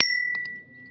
Sound effects > Objects / House appliances
High Bell
Bell, High, Pitch